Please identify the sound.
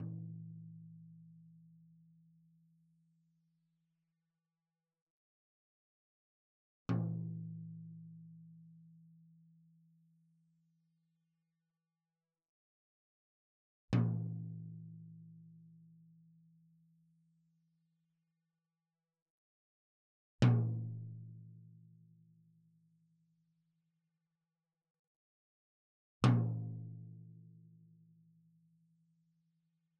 Music > Solo percussion
med low tom-velocity build sequence 2 (2) 12 inch Sonor Force 3007 Maple Rack
acoustic, beat, drum, drumkit, drums, flam, kit, loop, maple, Medium-Tom, med-tom, oneshot, perc, percussion, quality, real, realdrum, recording, roll, Tom, tomdrum, toms, wood